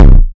Instrument samples > Percussion
BrazilFunk Kick 30
Kick, Distorted, BrazilianFunk